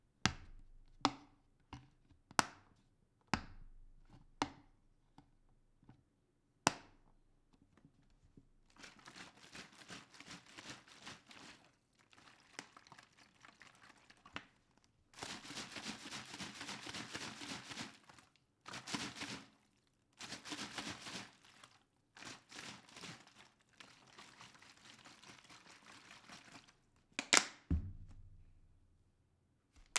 Sound effects > Objects / House appliances
Plastic bottle small amount of water, open close cap,pickup, shake light/medium/hard, slide, squeeze
Opening plastic bottle cap, shaking it medium/light/hard, delayed shake, spinning lower half, squeeze, sliding on fabric(mousepad). Recorded from 10-30cm away from the microphone(slide motion) with a XLR MV7.
bottle, pickup, plastic, plastic-bottle, shake, slide, water